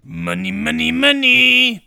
Speech > Solo speech
money money money
cash, male, man, voice, human, money